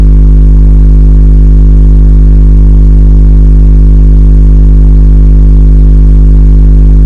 Soundscapes > Synthetic / Artificial
[LOUD] Microphone Buzz Noise
Buzzing noise made with a very cheap mic